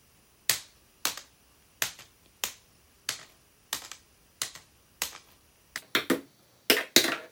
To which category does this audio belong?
Sound effects > Human sounds and actions